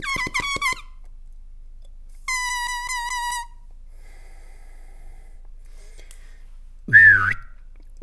Sound effects > Objects / House appliances
mouth foley-006 random squeeks
beatbox, blow, bubble, bubbles, foley, mouth, perc, sfx, squeek, whistle